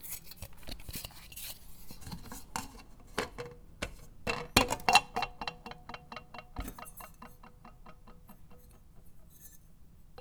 Sound effects > Other mechanisms, engines, machines
metal shop foley -225
oneshot, sound, perc, little, bop, metal, foley, tools, sfx, strike, bang, boom, shop, pop, fx, tink, percussion, knock, rustle